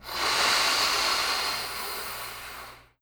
Sound effects > Objects / House appliances
HMNBlow-Samsung Galaxy Smartphone, CU Rubber Balloon, Inflate Nicholas Judy TDC
A rubber balloon inflated.
rubber, balloon, foley, inflate, Phone-recording